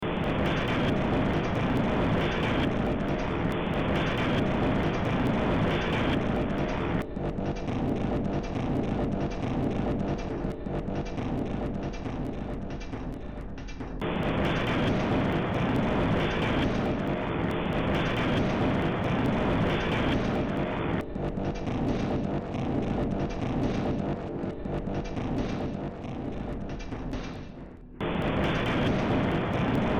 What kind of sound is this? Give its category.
Music > Multiple instruments